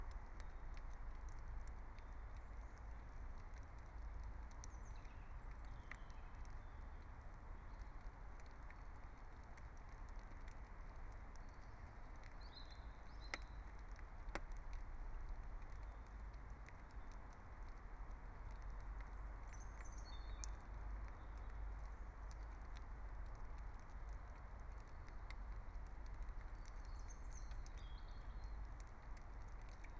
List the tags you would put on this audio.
Soundscapes > Nature
meadow
natural-soundscape
nature

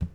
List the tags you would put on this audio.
Sound effects > Objects / House appliances
carry
plastic

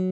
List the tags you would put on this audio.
Instrument samples > String
cheap
stratocaster
sound

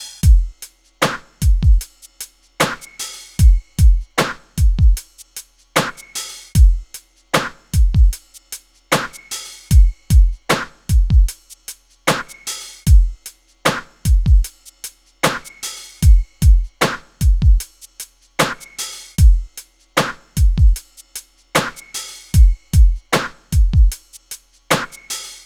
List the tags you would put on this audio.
Music > Solo instrument
Drum
loop
smooth

